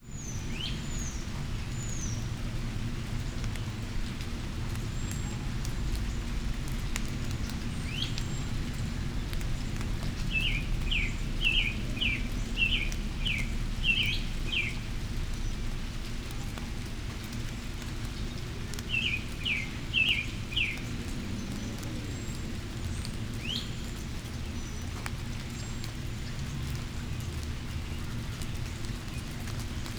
Soundscapes > Nature
Light spring rain and kids and birds MAY 13 2025 vTWO
A nice surprise for me while I was recording in a small, Midwestern USA town. A nice, light shower with small sprinkles supplementing the Robin singing. Occasionally, you will hear kids in the distance playing in a popular local park. The best part of this recording, I think, was when I was actually in the moment with my headphones on recording it. The light rain sprang up, and continued even with a majority blue sky! Hope you enjoy this natural, small-town peaceful soundscape. Recording done on Monday May 13, 2025 using the following equipment: Zoom F4 recorder Lom UsiPro Omnidirectional microphone with furry windscreen